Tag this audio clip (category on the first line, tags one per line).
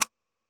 Sound effects > Electronic / Design
interface
ui